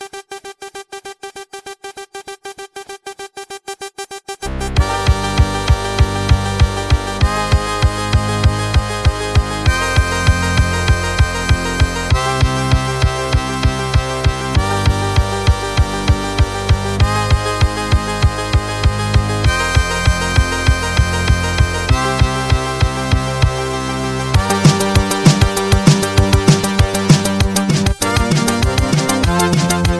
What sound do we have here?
Music > Multiple instruments
Battle 2 music
just music for my personal game, ai generated by Udio Beta (v1.5 allegro), prompted "futuristic music for a fighting game, use synthesizers and drum kits"